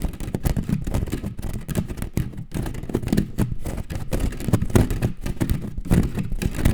Sound effects > Objects / House appliances
Sliding my fingers on a old wood chair